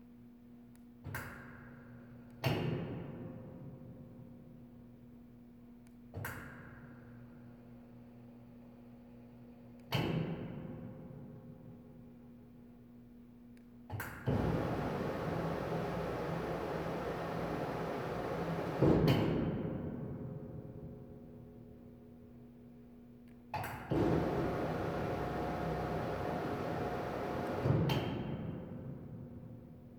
Sound effects > Other mechanisms, engines, machines
22 Ton Bridge Crane (up down horn and move)
I was alone at my place of work after everyone left, and decided to get some folly of the new 22 ton bridge crane they installed.
big, crane, echo, electric, equipment, factory, hoist, horn, industrial, machine, mechanical, metal, moveing, movement, rattle, tools